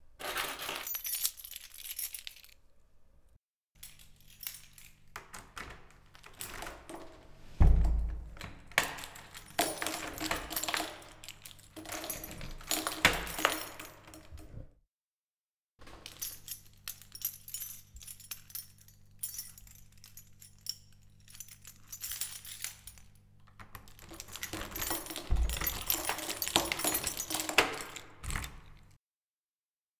Sound effects > Objects / House appliances
House Keys
Opening and closing a door of my apartment with my keys.
close, closing, door, door-close, door-open, foley, house-keys, key-jingling, key-rattle, keys, latch, lock, locking, metal-click, open, opening, shut, turning-key, unlock, unlocking